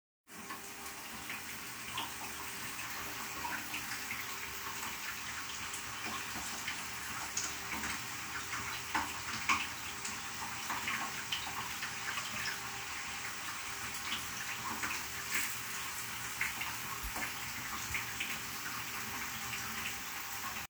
Soundscapes > Indoors
Shower Sounds
Sounds of a shower. Recorded on 22nd August 2025 using the Recorder app on a Google Pixel 9a phone. I want to share them with you here.